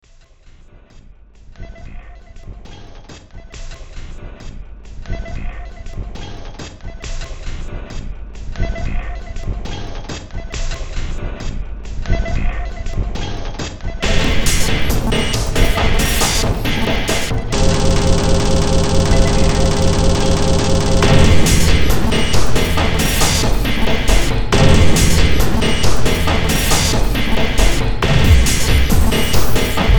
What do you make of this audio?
Music > Multiple instruments
Demo Track #3893 (Industraumatic)
Cyberpunk, Noise, Horror, Soundtrack, Underground, Sci-fi, Games, Industrial, Ambient